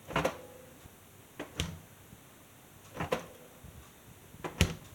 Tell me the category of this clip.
Sound effects > Objects / House appliances